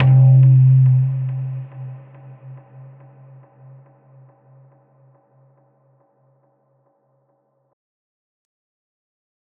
Instrument samples > Synths / Electronic
Deep Pads and Ambient Tones23
From a collection of 30 tonal pads recorded in FL Studio using various vst synths